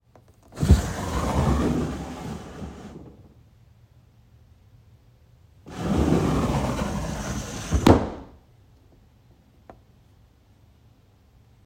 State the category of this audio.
Sound effects > Objects / House appliances